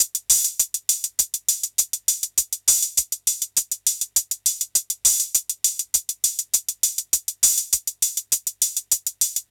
Music > Solo percussion
101 606HH Loop 01
Vintage, Analog, 606, music, Loop, Modified, Bass, Drum, Kit, Synth, Mod